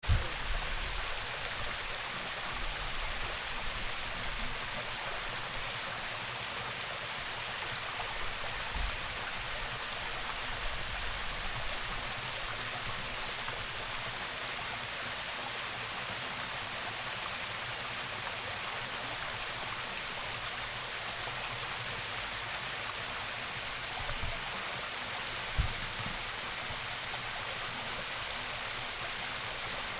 Soundscapes > Nature
hot springs waterfall in Banff Alberta Canada recorded on zoom h1n
flowing-water, hot-springs, waterfall